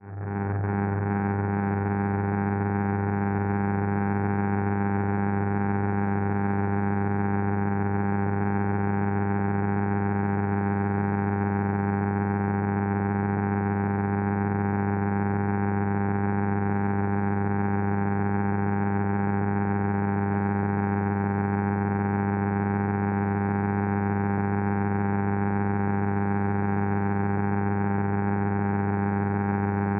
Sound effects > Other mechanisms, engines, machines
Recorded using a contact mic to a powered on household tower fan.